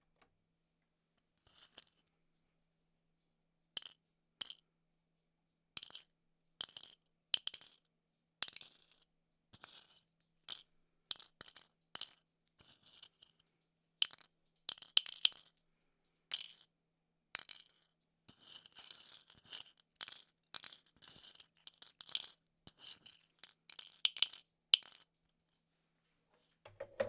Sound effects > Human sounds and actions
Hair pins dropped in wooden bowl and mixed about.
Hairpins dropped in a wooden bowl and handled.
bobby, bobbypin, drop, dropped, hair, hairpin, jangle, metal, pin, rattle, tink